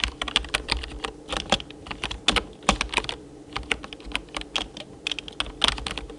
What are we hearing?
Sound effects > Objects / House appliances
keyboard typing slow loop2
keyboard typing but slow.